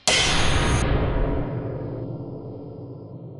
Sound effects > Electronic / Design
Impact Percs with Bass and fx-031
deep, fx, sfx, foreboding, brooding, impact, cinamatic, bash, mulit, explosion, hit, bass, low, explode, crunch, percussion, theatrical, combination, perc, looming, ominous, oneshot, smash